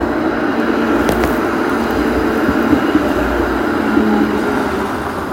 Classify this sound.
Sound effects > Vehicles